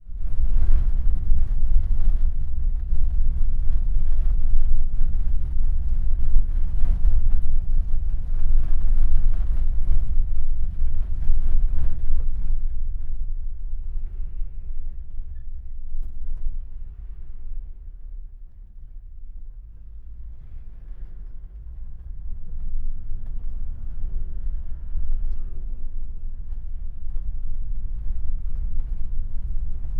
Soundscapes > Nature
Car interior in a Jeep on safari
In a Jeep on safari in Lake Manyara National Park in Tanzania. The car rumbles, stops twice, then continues. From #1:25 on, the road becomes particularly bumpy. Recorded with an Olympus LS-14.